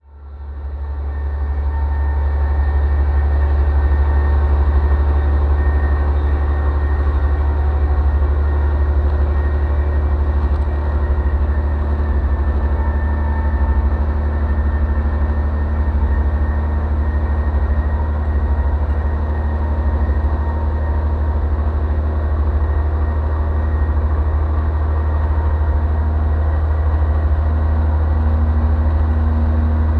Sound effects > Other mechanisms, engines, machines
A recording of the inside of a train from a recent trip to London using a contact mic.
contact, field, mic, recording, rumble, train